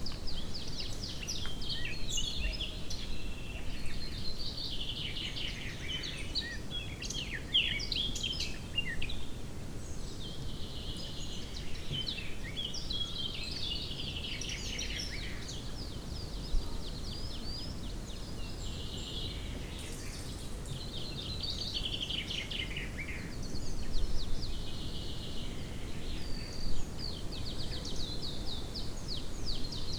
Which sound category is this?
Soundscapes > Nature